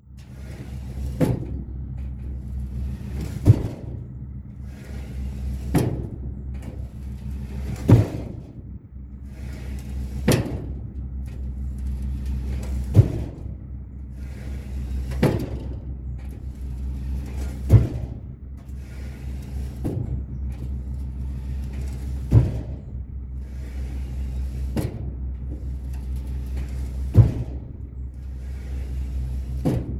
Sound effects > Objects / House appliances
DOORAppl-Samsung Galaxy Smartphone, CU Grill, Open, Close Nicholas Judy TDC
A grill door opening and closing.
close, door, foley, grill, Phone-recording